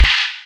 Instrument samples > Percussion
China Yunnan 3
metal, Paiste, Bosphorus, Stagg, smash, sinocymbal, clash, Zildjian, crash, UFIP, slam, flangcrash, bang, 19-inches-Zildjian-Z3, Soultone, Istanbul, clang, crack, boom, Chinese, China, sinocrash, cymbal, Meinl, Sabian, crunch, metallic